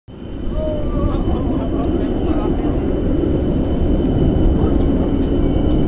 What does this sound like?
Sound effects > Vehicles
Outdoor recording of a tram at the Helsinki Päärautatieasema tram stop. Captured with a OnePlus 8 Pro using the built‑in microphone.
vehicle, rail, tram